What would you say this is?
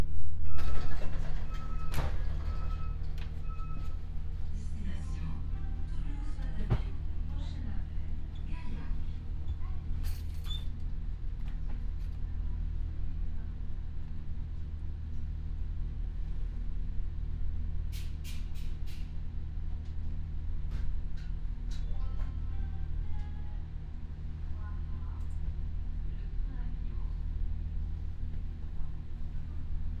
Soundscapes > Other
250710 09h51-10h50 Albi - TLS
Subject : Traveling from Albi to Toulouse, ambience inside the train. Sitting backwards to the ride, rows of seats to my left side. Waggon entrance infront of me (every time except arrival, right door opened) toilets behind me. Starting at 09h51 finishing and arriving at 10h50, train's announced arrival time 10h53. Train 870005 Date YMD : 2025 July 10. Location : In train Occitanie France. Soundman OKM 1 Weather : Processing : Trimmed in Audacity.
TLS, FR-AV2, travel, Juillet, OKM1, Tascam, France, summer, SNCF, field-recording, Albi, 2025, Train, July, vehicle-ambience, Toulouse, Soundman, Binaural